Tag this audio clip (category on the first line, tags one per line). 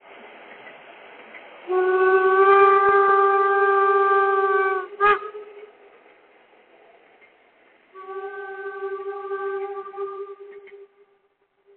Soundscapes > Other
signal
train
locomotive